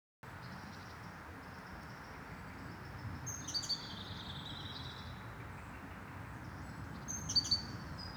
Soundscapes > Nature
Forest ambience 04
Stereo recording of forest with birds and cars in the background.
Bird, Birds, Birdsong, Day, Environment, Field-recording, Forest, Nature, Park, Peaceful, Traffic, Trees, Wind